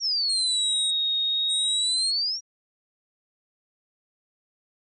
Sound effects > Experimental
This sound was made and processed in DAW; If you place this sound on a spectrogram/spectrum analyzer with update idealy set to 20.00 ms, you can see the frequency-drawn picture of a smiley face with heart shaped eyes!